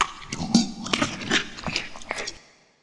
Sound effects > Experimental
Creature Monster Alien Vocal FX (part 2)-051
zombie, growl, Alien, weird, gross, Monster, grotesque